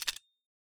Sound effects > Other mechanisms, engines, machines
Circuit breaker shaker-003
Broken Circuit breaker, internal components generate sound when shaken. I recorded different variations of it.
shaker, percusive, sampling, recording